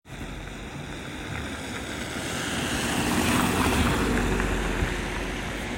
Sound effects > Vehicles
car sunny 02
A recording of a car passing by on Insinöörinkatu 41 in the Hervanta area of Tampere. It was collected on November 12th in the afternoon using iPhone 11. The weather was sunny and the ground was dry. The sound includes the car engine and the noise from the tires on the dry road.
vehicle, car